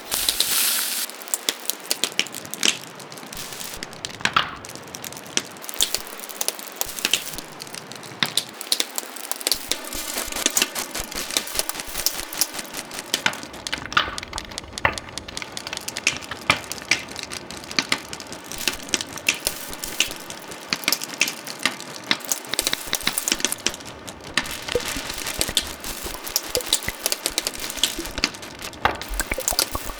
Sound effects > Electronic / Design
Dustmite Chorale 1
The 'Dustmites Chorale' pack from my 'Symbiotes' sampler is based on sounds in which the dominant feature is some form of surface noise, digital glitch, or tape hiss - so, taking those elements we try to remove from studio recordings as our starting point. This is based on a ZOOM H2N recording of popped bubble / plastic wrap, which is juxtaposed with another kind of synthetic 'bubbling': randomized harmonics run through resonant band-pass filter.
crackle, noise, dust, surface, bubblewrap, harmonics, band-pass-filter